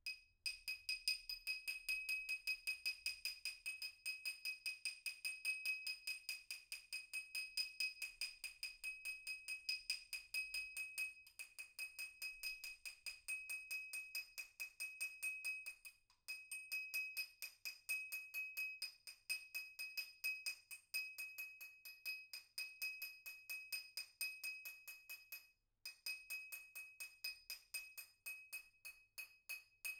Sound effects > Other
Glass applause 8
applause, clinging, FR-AV2, glass, individual, person, Rode, single, solo-crowd, stemware, wine-glass, XY